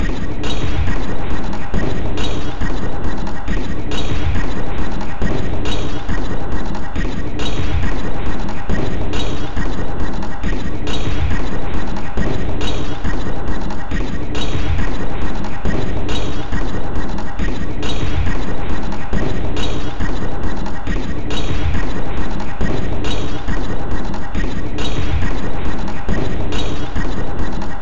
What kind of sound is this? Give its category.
Instrument samples > Percussion